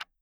Sound effects > Other mechanisms, engines, machines
clock tickC2
single tick, isolated Works best in tandem with the paired sound (ie: clock_tickC1 and clock_tickC2) for the back and forth swing.
clacking, clock, hand, minute, second, seconds, ticking, ticks, tick-tock, time